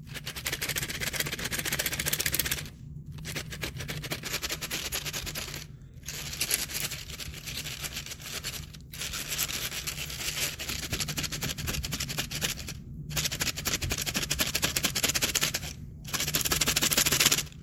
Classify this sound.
Sound effects > Objects / House appliances